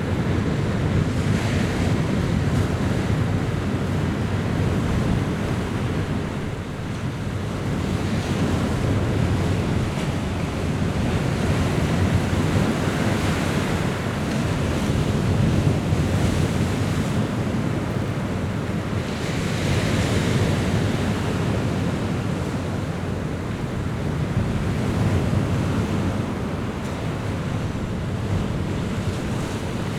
Soundscapes > Nature
Stormy sea on the island of Elba. Taken in a sheltered spot. What a spectacle!
beach, coast, ocean, sea, stormy, water, wave